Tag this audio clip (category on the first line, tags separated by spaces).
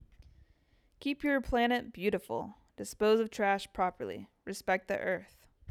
Speech > Solo speech
EarthCare DontLitter PublicService PSA ProtectNature EcoFriendly KeepItClean